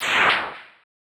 Sound effects > Electronic / Design
SHARP SYNTHETIC ANALOG CLICK
BEEP, BOOP, CHIPPY, CIRCUIT, COMPUTER, DING, ELECTRONIC, EXPERIMENTAL, HARSH, HIT, INNOVATIVE, OBSCURE, SHARP, SYNTHETIC, UNIQUE